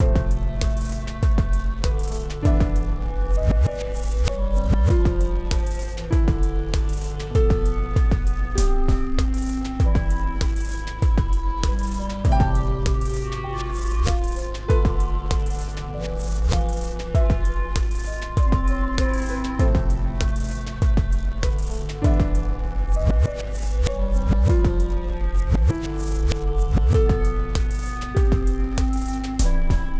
Music > Multiple instruments
Chill Yet Crunchy Beat Flute Melody Loop 98bpm
A chill but slighty crunchy beat and melody loop created with Fl studio using Microtonic and phaseplant, processed with Reaper